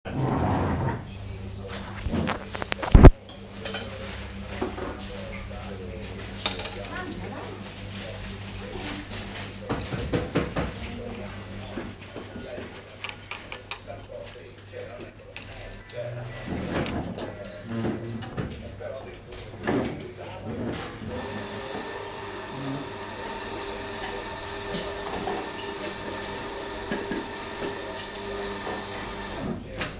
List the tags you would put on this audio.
Soundscapes > Urban
coffee urban voices soundscape italy cafe milan city

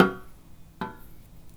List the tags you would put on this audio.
Sound effects > Other mechanisms, engines, machines
perc shop hit twang sfx metallic

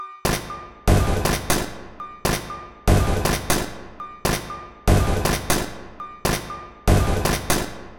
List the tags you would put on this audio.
Instrument samples > Percussion
Ambient Industrial Samples Soundtrack Underground Weird

